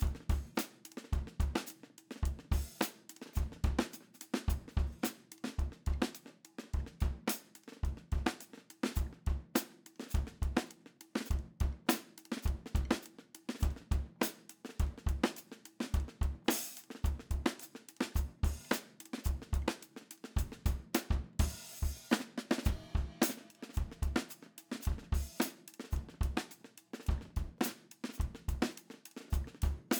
Music > Solo percussion
Simple groove 106 BPM in 4
live, drums, kit, recording, studio